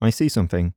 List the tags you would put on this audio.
Speech > Solo speech
2025 Adult Calm FR-AV2 Generic-lines Hypercardioid july Male mid-20s MKE-600 MKE600 Sennheiser Shotgun-mic Shotgun-microphone Single-mic-mono Tascam VA Voice-acting

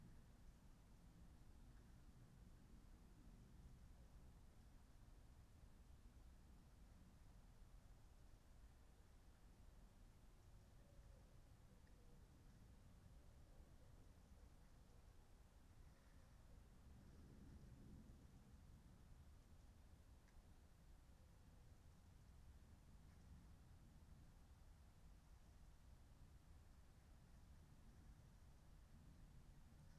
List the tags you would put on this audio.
Soundscapes > Nature
raspberry-pi nature data-to-sound artistic-intervention soundscape Dendrophone modified-soundscape field-recording

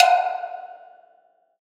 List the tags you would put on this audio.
Instrument samples > Percussion
SFX Opera Percussion Effect Chinese China FX

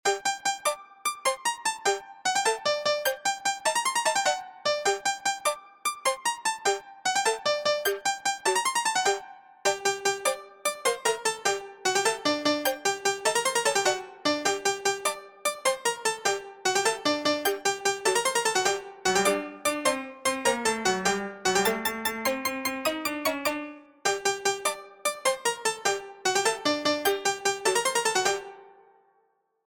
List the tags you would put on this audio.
Music > Multiple instruments
vgm piano lo-fi

